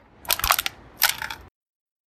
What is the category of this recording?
Sound effects > Objects / House appliances